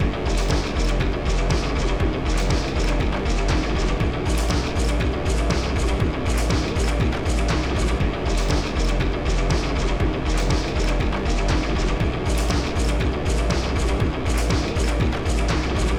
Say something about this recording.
Music > Multiple instruments
cyberpunk memories beat
Stylish future dystopia IDM beat with de-tuned drums. Features heavily modified samples from PreSonus loop pack included in Studio One 6 Artist Edition
android, future, idm, mechanistic